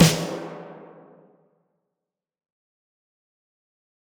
Instrument samples > Percussion
Puckin' Snare
One shot sample of an Orange County Custom Maple Steel Snare Drum!